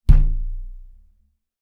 Sound effects > Objects / House appliances
Designing a low-frequency percussive drum sound by hitting drywall at home. In this one, I found a great sweet spot of tick and rumble. Recorded with a SONY PCM D-100, default microphone.